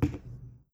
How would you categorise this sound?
Sound effects > Objects / House appliances